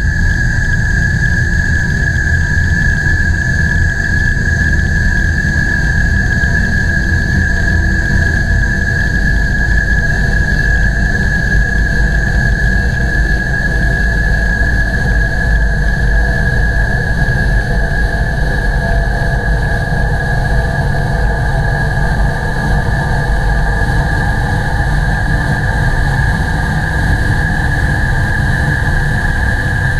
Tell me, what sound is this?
Sound effects > Experimental
"Upon entering the house I could sense a presence, something watching my every move." First I used a Zoom H4n multitrack recorded to capture various ambient sounds from different spots in my home. Those audio files were then imported into Audacity where I layered and applied various effects upon them. The final outcome is what you hear with this upload.